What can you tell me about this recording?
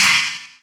Instrument samples > Percussion
Slightly low-pitched part of my Zildjian China and spock crashes in various lengths (see my crash folder). tags: spock Avedis bang China clang clash crack crash crunch cymbal Istanbul low-pitchedmetal Meinl metallic multi-China multicrash Paiste polycrash Sabian shimmer sinocrash Sinocrash sinocymbal Sinocymbal smash Soultone Stagg Zildjian Zultan